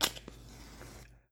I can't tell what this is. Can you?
Sound effects > Objects / House appliances
A match strike.